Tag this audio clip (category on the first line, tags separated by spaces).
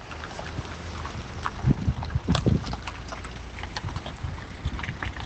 Sound effects > Vehicles
car studded-tyres traffic